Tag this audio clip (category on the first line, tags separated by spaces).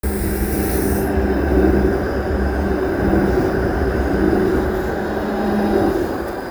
Sound effects > Vehicles
field-recording tram traffic